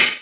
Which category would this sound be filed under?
Instrument samples > Percussion